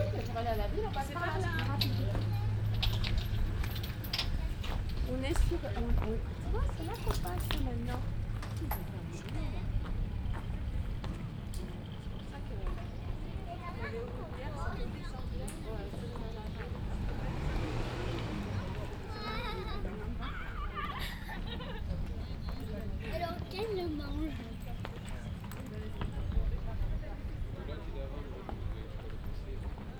Soundscapes > Urban
Subject : Walkthrough of the newly built bridge walk connecting Pratgraussal and the Cathedral. Going from the northern side to the cathedral side. Date YMD : 2025 06 09 (Monday) 10h47 Location : Pratgraussal Albi 81000 Tarn Occitanie France. Outdoors Hardware : Tascam FR-AV2, Soundman OKM1 Binaural in ear microphones. Weather : Clear sky 24°c ish, little to no wind. Processing : Trimmed in Audacity. Probably a 40hz 12db per octave HPF applied. (Check metadata) Notes : That day, there was a triathlon going on.